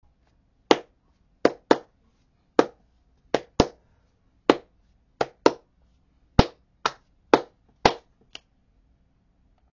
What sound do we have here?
Sound effects > Human sounds and actions
Clapping Men
Clap at a rythm
Men, Beat, Clap